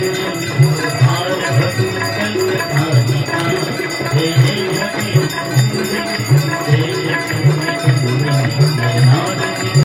Music > Multiple instruments
Ganga Aarti Dashashwamedh Ghat, Varanasi
02/01/2025 Varanasi Ganga Aarti (Light Ceremony) performed on the banks of Gangesh in Varanasi iPhone 15 Pro
ceremony, ganges, india